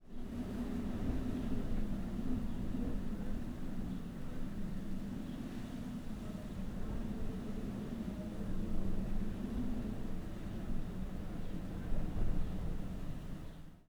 Soundscapes > Nature
Wind cables #1
Wind rushing through overhead electrical cables, creating an eerie singing sound. Recorded in the countryside. The Zoom H2essential recorder was used to record this sound.